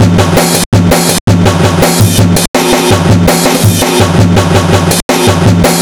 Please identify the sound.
Music > Other
old drum 165 bpm
FL studio 9 . vst slicex loop déconstruite
bass, beat, break, breakbeat, breakloop, drumbeat, drumloop, jungle, kick